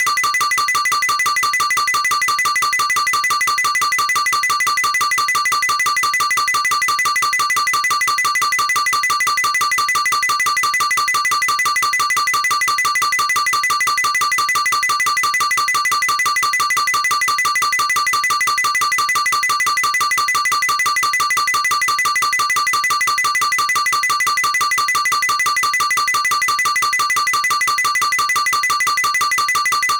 Sound effects > Electronic / Design
TOONMisc-CU Agogo Bell Riot, Looped Nicholas Judy TDC
An agogo bell riot.